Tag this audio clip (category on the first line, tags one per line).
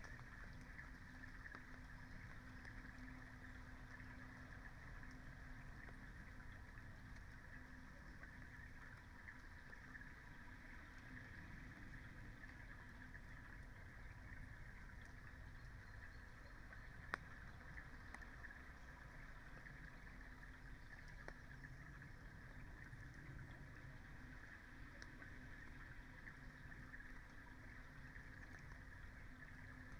Soundscapes > Nature
nature
field-recording
alice-holt-forest
weather-data
data-to-sound
modified-soundscape
natural-soundscape
phenological-recording